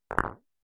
Sound effects > Other mechanisms, engines, machines
Lawn Bowl-Single-Contact-00
First of a set of four lawn bowls contact sounds. Made from Open Sources with Audacity. Not all sources were actually lawn bowls.